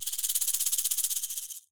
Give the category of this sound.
Instrument samples > Percussion